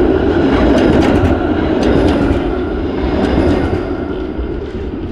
Sound effects > Vehicles
Tram00042791TramPassing
city, field-recording, tram, tramway, transportation, vehicle, winter